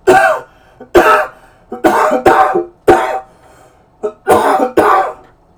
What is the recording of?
Sound effects > Human sounds and actions
HMNCough-Blue Snowball Microphone, CU Thru Didgeridoo Nicholas Judy TDC
Someone coughing through a didgeridoo.
Blue-brand, cough, didgeridoo, Blue-Snowball